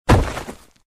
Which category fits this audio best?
Sound effects > Human sounds and actions